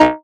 Instrument samples > Synths / Electronic
TAXXONLEAD 4 Eb
additive-synthesis, bass, fm-synthesis